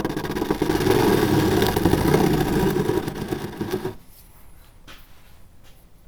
Sound effects > Other mechanisms, engines, machines
Handsaw Tooth Teeth Metal Foley 16
foley, fx, handsaw, hit, household, metal, metallic, perc, percussion, plank, saw, sfx, shop, smack, tool, twang, twangy, vibe, vibration